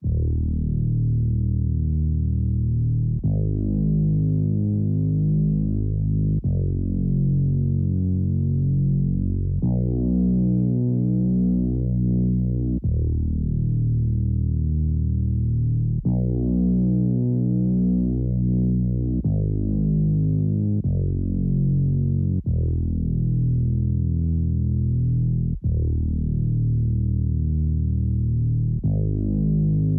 Music > Solo instrument
alike bass 75bpm 1lovewav
1lovewav, bass, loop, retro, sub, synth, synth-bass